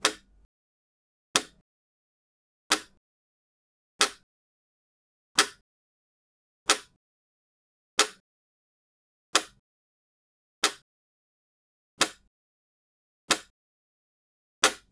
Instrument samples > String
Guitar Thumb Slap Pack (Snare)
I have been looking for this on the internet but can't find one that I was looking for and one that is free, so I recorded my own using my father's old nylon guitar. Do what ever you want with it. So basically this is meant to be used along with a guitar VST or guitar instrument as guitar thumb slap percussion. Sometimes people would even include squeaky or screeching noise from guitar sound to make synthesized guitar sound more realistic. 🎤Recording: Microphone → Acer Aspire 5 built-in microphone. Processing → Audacity. Recording environment → Cement-walled bedroom. Proximity to recording device → Close.